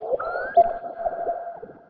Soundscapes > Synthetic / Artificial
LFO Birdsong 61
Birdsong, LFO, massive